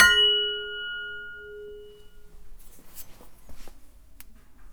Sound effects > Other mechanisms, engines, machines
metal shop foley -081
metal, boom, pop, knock, shop, tink, oneshot, rustle, perc, thud, tools, foley, bam, percussion, fx, wood, bang, sfx, sound, bop, little, strike, crackle